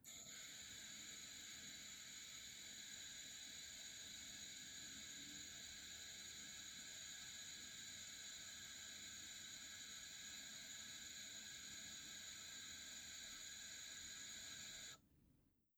Sound effects > Other
AIRHiss-Samsung Galaxy Smartphone Aroma360, Mysterious Mists Nicholas Judy TDC
An aroma360 air hissing. Mysterious mists.
air, aroma360, hissing, mists, mysterious, Phone-recording